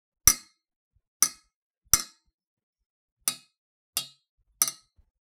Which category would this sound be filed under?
Sound effects > Objects / House appliances